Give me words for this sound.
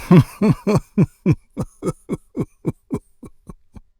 Sound effects > Human sounds and actions
Man- Smug laugh 1
A man's smug, obnoxious laugh.
British, chuckles, evil, human, man